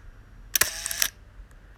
Sound effects > Human sounds and actions
Old Digicam Shutter (Leica M8)
Leica M8 shutter click. Recorded with iPhone voice recover app
shutter, leica, photography, camera, photo